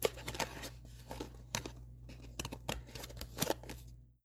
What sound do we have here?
Sound effects > Objects / House appliances
FOLYProp-Samsung Galaxy Smartphone Chinese Takeout Box, Open, Close Nicholas Judy TDC
A chinese takeout box opening and closing.
box, chinese, close, foley, open, Phone-recording, takeout